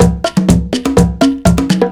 Music > Other
african percussions
loop ethnique composed with fl studio 9
djembe, world, african, conga, drums, beat, drumloop, bongo